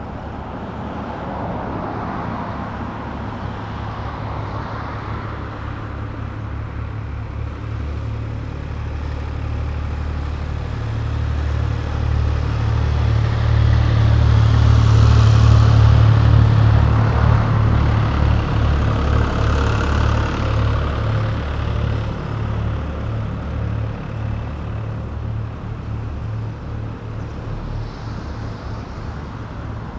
Soundscapes > Urban
Subject : A 4 channel recording in Rivesaltes at Pont Jacquet Date YMD : 2025 04 02 Location : Rivesaltes 66600 Pyrénées-Orientales, Occitanie, France. Hardware : Zoom H2N 4 channel mode (Beware, the channel 3/4 has the L/R from the recorders perspective even if facing "backwards") Weather : Grey Sky, Little to no wind. Processing : Trimmed and Normalized in Audacity. Notes : Microphone was facing West.